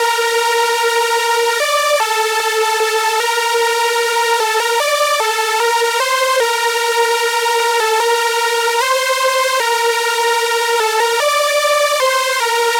Music > Solo instrument
Hardstyle Lead Melody 2 150 bpm
A test of a lead sound that I synthed with phaseplant. A shit melody that randomly made.